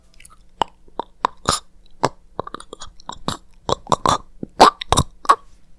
Sound effects > Human sounds and actions
A choking, gurgling sound as if one is being squeezed by the throat.